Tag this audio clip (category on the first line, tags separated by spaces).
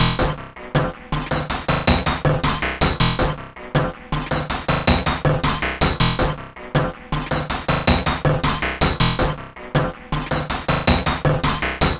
Instrument samples > Percussion
Industrial Loop Loopable Samples Drum Ambient Alien Underground Dark Weird Soundtrack Packs